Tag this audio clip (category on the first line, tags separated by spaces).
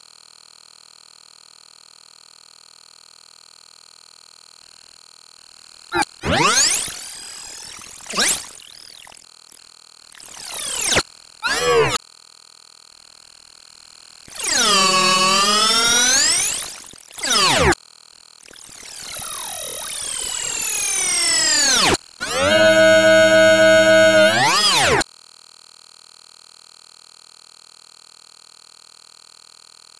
Sound effects > Electronic / Design
alien ambience analog bass creature creepy dark digital experimental extraterrestrial fx glitch glitchy gross industrial loopable machanical machine monster otherworldly sci-fi sfx soundeffect sweep synthetic trippy underground warped weird wtf